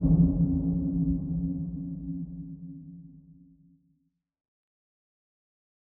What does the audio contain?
Sound effects > Electronic / Design
POWERFUL UNDERGROUND HIT
BASSY, DIFFERENT, EXPERIMENTAL, INNOVATIVE, RAP, RATTLING, RUMBLING, TRAP, UNIQUE